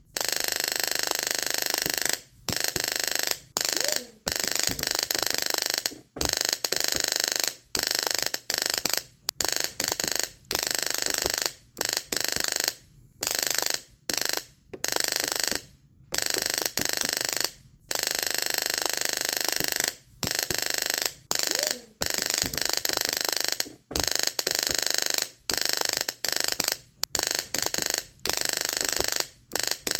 Sound effects > Electronic / Design
ELECArc-Samsung Galaxy Smartphone, CU Tesla Coil, Sparking, Bursts Nicholas Judy TDC
A tesla coil arcing and sparking in bursts.
arc, burst, Phone-recording, spark, tesla-coil